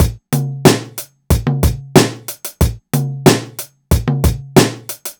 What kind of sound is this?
Music > Other
hip hop drums 92 bpm ver 8
snare, hiphop, drums, drum, groovy, beat, drumloop, drum-loop, breakbeat, percs, loop